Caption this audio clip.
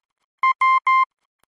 Sound effects > Electronic / Design
A series of beeps that denote the letter W in Morse code. Created using computerized beeps, a short and long one, in Adobe Audition for the purposes of free use.
Language, Telegragh, Morse